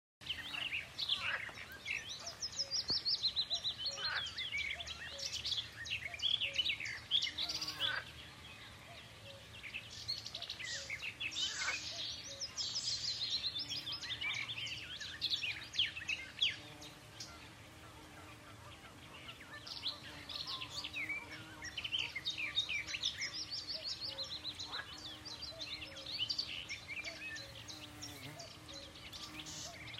Soundscapes > Nature
sound was recorded in a very quiet place in the middle of a nature reserve